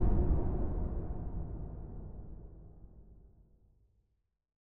Sound effects > Electronic / Design
RUMBLY LONG POWERFUL HIT
BASSY, BOOM, DEEP, DESIGN, DIRECT, EDITING, EXPLOSION, HIT, IMPACT, LAYERING, LOW, RATTLING, RUMBLING, SIMPLE